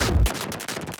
Sound effects > Experimental
destroyed glitchy impact fx -021
hiphop, fx, zap, crack, whizz, snap, lazer, impacts, glitch, impact, perc, percussion, clap, laser, sfx, idm, experimental, alien, pop, otherworldy, abstract, edm, glitchy